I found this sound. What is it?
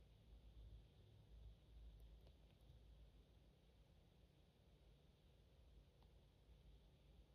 Soundscapes > Indoors
UPF Library
I recorded these samples inside the Pompeu Fabra University Library on the Ciutadella campus in Barcelona during a late afternoon study period. The space is naturally quiet, with soft HVAC hum.
indoors, quietbarcelona, library